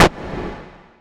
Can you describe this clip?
Instrument samples > Synths / Electronic
CVLT BASS 97
wobble, clear, lfo, subwoofer, subs, low, subbass, wavetable, stabs, bassdrop, drops, synthbass, bass, lowend, sub, synth